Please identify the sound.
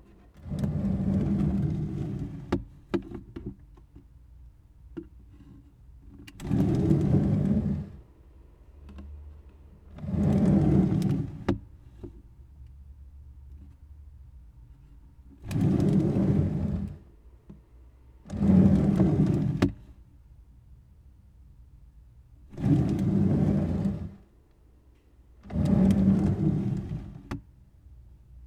Sound effects > Objects / House appliances
With the recorder inside the drawer, sleek pulling and pushing it 3 times as smoothly as possible. Recorded with Zoom H2.